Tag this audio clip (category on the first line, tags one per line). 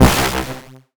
Instrument samples > Synths / Electronic
fm-synthesis
bass
additive-synthesis